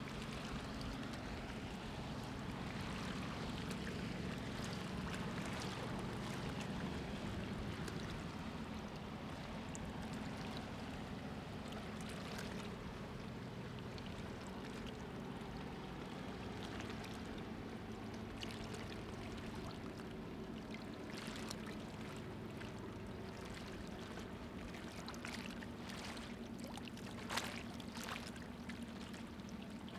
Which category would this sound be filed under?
Soundscapes > Urban